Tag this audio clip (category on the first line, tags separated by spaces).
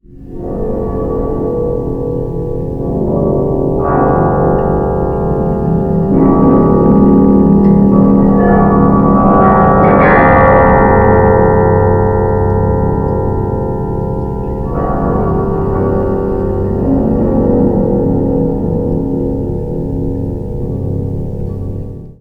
Soundscapes > Other
dischordant wind strings swells storm